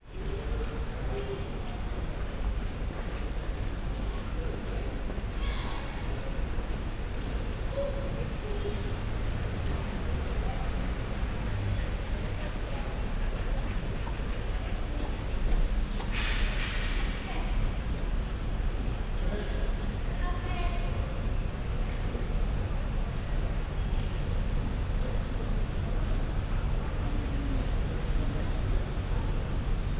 Soundscapes > Urban
Entrance to shopping centre
Standing at the entrance to the Marlands Shopping Centre in Southampton. Nice bit of reverb from the hallway on the standard set of street sounds.
UK, Southampton, commercial, mall, centre, Marlands, shopping